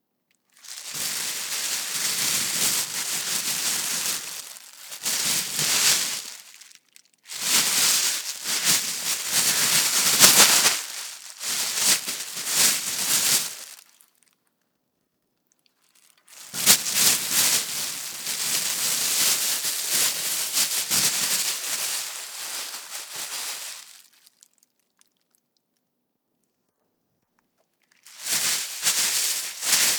Sound effects > Objects / House appliances
OBJPack Plastic Bag
Handling a plastic bag, the type used to put fruit and veg in at a supermarket.
bag, crush, crushing, handle, handling, plastic, sfx